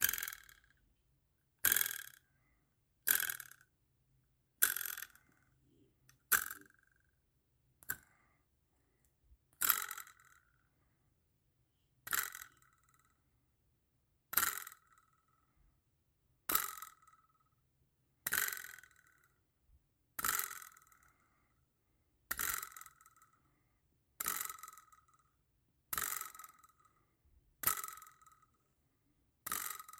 Sound effects > Objects / House appliances
TOONTwang-Samsung Galaxy Smartphone, CU Spoon, Various Nicholas Judy TDC
Various spoon twangs.
twang, Phone-recording, spoon, cartoon